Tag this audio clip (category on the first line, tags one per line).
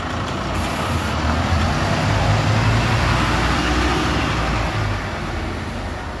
Sound effects > Vehicles
transportation
vehicle
bus